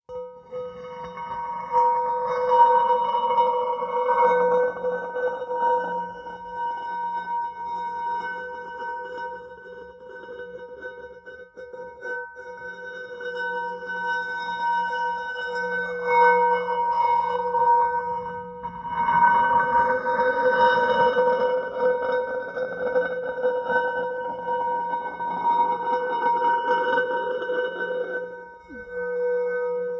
Sound effects > Other
Metal on metal scrapping using a contact mic.
metal, scrapping, contact, mic